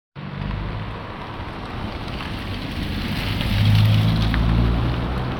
Sound effects > Vehicles
toyota yaris
Car, Tampere, field-recording